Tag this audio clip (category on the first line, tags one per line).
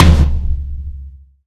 Instrument samples > Percussion
16x16
PDP
Sonor
strike
Tama